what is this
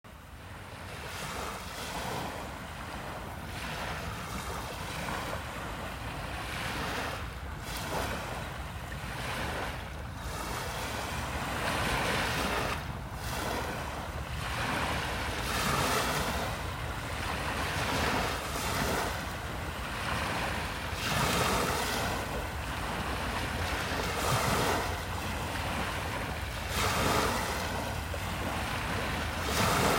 Sound effects > Natural elements and explosions
Ocean Surf on Beach on Martha's Vineyard
Ocean surf hitting one of the beaches on Martha's Vineyard; one of the beaches that served as a filming location for the 1975 film "Jaws".